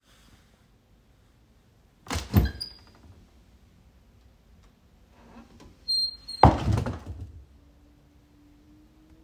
Sound effects > Objects / House appliances
Pantry Door Open & Close
Wooden kitchen pantry door is opened and closed.